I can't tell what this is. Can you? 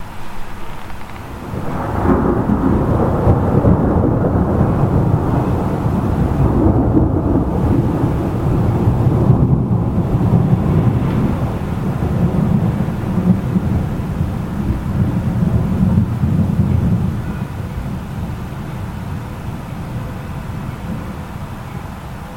Soundscapes > Urban
Thunder on a rainy afternoon, outer town
Thunder recorded in Piacenza, Italy during a rainy afternoon in late spring 2025. Recorded with a cheap Sony ICD-UX300 audio recorder as a test.
thunder, italy, storm, piacenza, rainstorm, rain, pioggia, lightning, field-recording, tuono, weather, italia